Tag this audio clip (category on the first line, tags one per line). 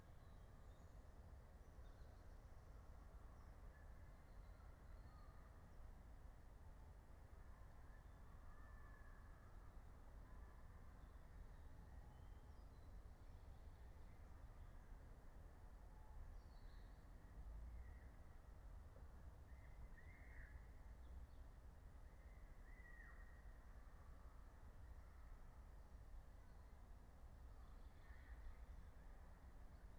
Soundscapes > Nature
natural-soundscape
phenological-recording